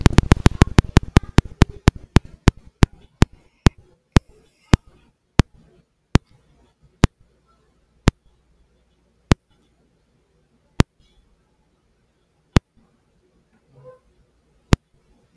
Sound effects > Electronic / Design
Clicking Original
click, clicker, clicks